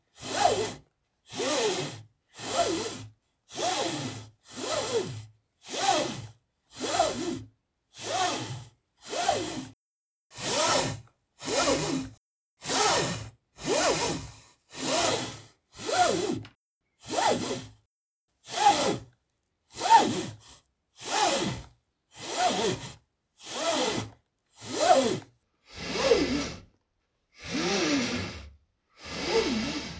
Sound effects > Objects / House appliances
Rope - Shearing
A rope making a shearing noise. * No background noise. * No reverb nor echo. * Clean sound, close range. Recorded with Iphone or Thomann micro t.bone SC 420.
sheriff, pulled, lasso, cord, pull, cowboy, movement, drawn, ropes, push, handsaw, line, rush, rigging, hang, nautical, western, draw, cordage, net, pulling, boat, shear, shearing, fisherman, string, whoosh, saw, pushing, rope